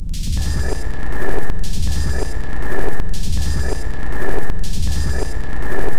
Instrument samples > Percussion
This 160bpm Drum Loop is good for composing Industrial/Electronic/Ambient songs or using as soundtrack to a sci-fi/suspense/horror indie game or short film.
Dark, Packs, Weird, Underground, Loopable, Loop